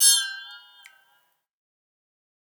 Instrument samples > Percussion
Water Triangle Perc
Triangle dipped in a sauna bucket and hit :3
drip, drop, perc, percussion, triangle, water